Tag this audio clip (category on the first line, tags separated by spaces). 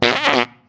Sound effects > Other
fart flatulence gas